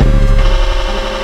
Sound effects > Electronic / Design
Industrial Estate 17
120bpm, Ableton, chaos, industrial, loop, soundtrack, techno